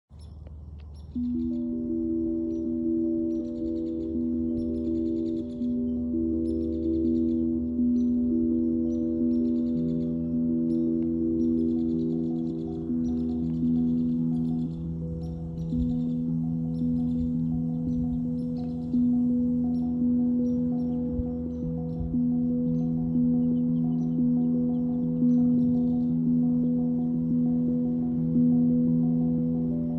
Music > Solo instrument
Friend Of A Friend - Ambient Tongue Drum
Ambient, Chill, Outdoor